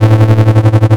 Instrument samples > Synths / Electronic
low bass with tremelo
Low sine bass with tremelo